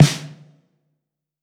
Instrument samples > Percussion
Snare Midlow
This sound made for Roland Spd-SX . It's a mid-low snare sound. Hope you enjoy with your gigs :)
drum, drumset, midlow, percussion, Snare, Spd-sx